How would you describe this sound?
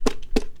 Sound effects > Objects / House appliances
A "Milk Carton" being hit and smacked on a surface in various ways recorded with a simple usb mic. Raw.
clack, foley, plastic, carton, click, industrial